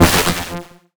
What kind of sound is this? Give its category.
Instrument samples > Synths / Electronic